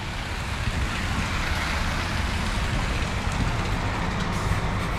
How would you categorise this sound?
Sound effects > Vehicles